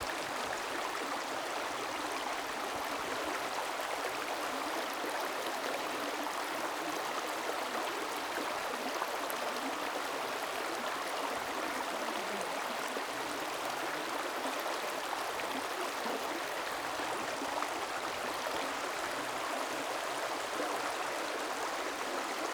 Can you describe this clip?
Soundscapes > Nature
Flowing river stream. Recorded on the Isle of Man, Glen Maye . Recorded with Rode NTG5, Tascam DR40.